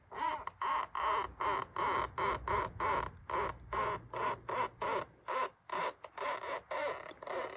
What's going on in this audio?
Sound effects > Objects / House appliances
The sound of curved plastic rubbing against tight fabric. It kind of sounds like a squeaky door... kind of.
creak, door, plastic, squeak, squeaky